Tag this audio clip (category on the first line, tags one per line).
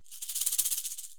Instrument samples > Percussion
percusive
sampling